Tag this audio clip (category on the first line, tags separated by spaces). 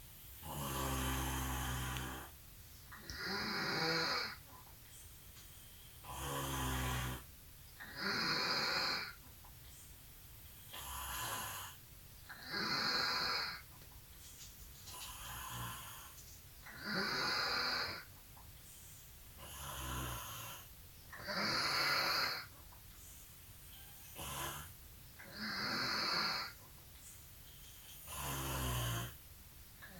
Sound effects > Human sounds and actions
bedroom breathing human male man night rest sleep sleeping snore snoring voice